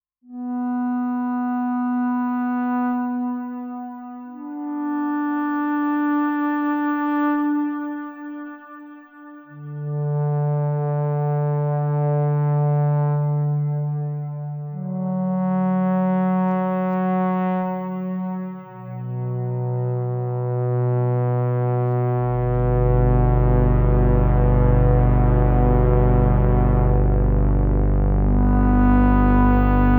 Music > Solo instrument
This is a recording which I did with the Soma Terra. I had an intense week at work. I recorded this in the silence of an early morning. It's getting cold outside and it's nice to stay in warm. Recorder: Tascam Portacapture x6.